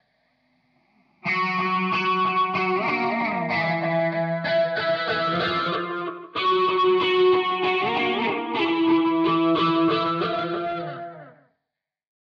Music > Solo instrument
Grunge dark guitar 94bpm 2
Having fun with my pedalboard and guitar. Good for alternative music such Shoegaze noise dreampop bedroom pop jangle pop alternative My pedalboard Behringer graphic eq700 Cluster mask5 Nux Horse man Fugu3 Dédalo Toxic Fuzz Retrohead Maquina del tiempo Dédalo Shimverb Mooer Larm Efectos Reverb Alu9 Dédalo Boss Phase Shifter Mvave cube baby 🔥This sample is free🔥👽 If you enjoy my work, consider showing your support by grabbing me a coffee (or two)!
ambient, atmospheric, chord, dreampop, electric, guitar, Random, sample, shoegaze, stratocaster